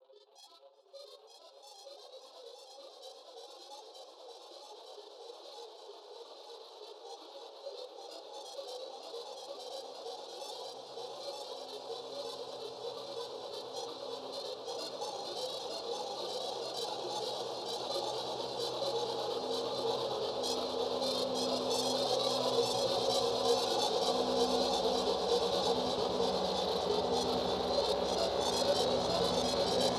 Soundscapes > Synthetic / Artificial
Horror pad
This pad was made and processed in DAW; Creepy pad#2 i guess, pretty raw and boring but i didn't know what to add more, so yeah. Made with sequencer on FM'd synth and added weird low saw bass. Enjoy. Ы.
horror, pad, scary, spooky, synthetic